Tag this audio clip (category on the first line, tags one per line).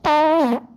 Sound effects > Other
fart
flatulence
gas